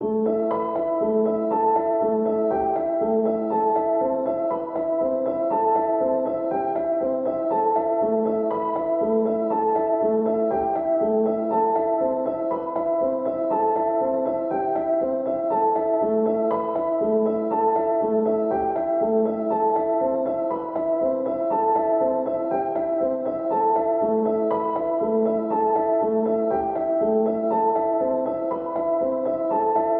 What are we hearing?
Music > Solo instrument
Piano loops 177 efect 4 octave long loop 120 bpm
120, 120bpm, free, loop, music, piano, pianomusic, reverb, samples, simple, simplesamples